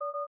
Sound effects > Electronic / Design
Activation sound notification
Made using a generated dial tone sound in ocenaudio and adjusting the pitch and speed of the sound. Used in my visual novel: R(e)Born_ Referenced with AKG K240.
beep, blip, computer, notification